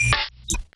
Sound effects > Experimental
Glitch Percs 13 gui flirps
abstract, clap, sfx, glitchy, hiphop, crack, pop, experimental, idm, impact, impacts, alien, otherworldy, lazer, zap, edm, snap, percussion